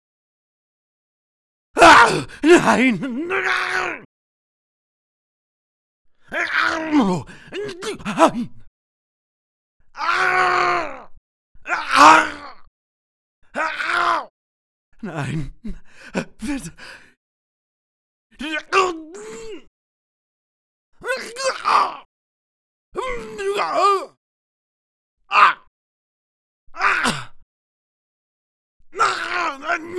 Sound effects > Human sounds and actions
Leidende Schreie Normal
A few screams from me for our torture scene in an Horrormaze.
fear, horror, pain, painfull, scream, screaming